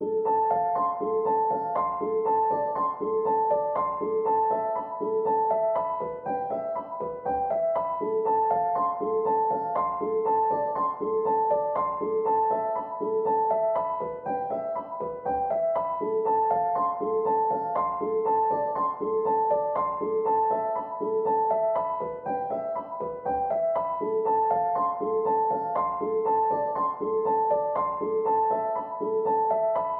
Music > Solo instrument
Piano loops 194 octave up short loop 120 bpm
120, 120bpm, free, loop, music, piano, pianomusic, reverb, samples, simple, simplesamples